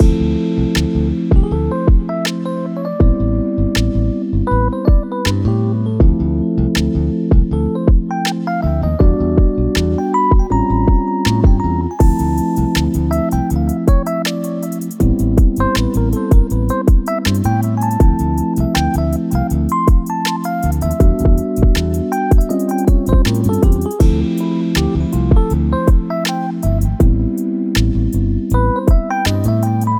Music > Multiple instruments
Denis Pavlov Smooth Jazz Loop 80BPM
Smooth Jazz Loop 80BPM - instruments: electric piano, electric bass, drums. - DAW: Logic Pro - VSTs: Logic Pro Instruments.
music, jazz, background, smooth, instrumental, loop, lounge